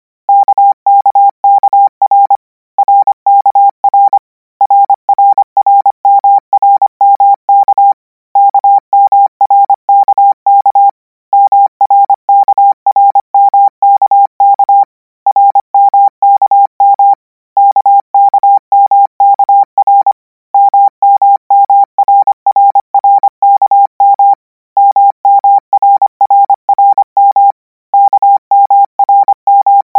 Sound effects > Electronic / Design
Practice hear characters 'KMR' use Koch method (after can hear charaters correct 90%, add 1 new character), 260 word random length, 25 word/minute, 800 Hz, 90% volume.